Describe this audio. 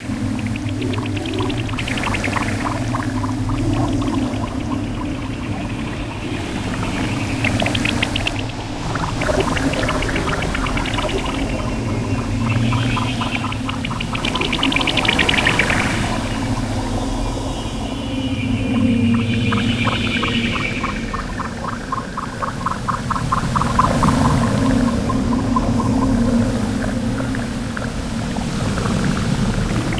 Soundscapes > Other
A complex and immersive soundscape representing an extraterrestrial jungle environment. The recording features layers of strange, bird-like chirps with a slightly metallic resonance, interspersed with deep, organic drones and the distant rustle of alien foliage. The atmosphere is mystical and slightly eerie, perfect for sci-fi games, fantasy world-building, or cinematic sound design. It evokes the feeling of standing in a lush, bioluminescent forest on a distant planet where the wildlife is both beautiful and unpredictable. Sound characteristics: High-frequency: Rhythmic, bird-like calls and insectoid clicks. Low-frequency: Steady, deep environmental rumble/wind. Mood: Mystical, otherworldly, natural yet synthetic.